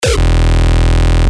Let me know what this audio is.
Instrument samples > Percussion
Frechcore kick Testing 1-E 195bpm
Bass synthed with phaseplant only.
Distorted, Frechore, hardcore, Hardstyle, Kick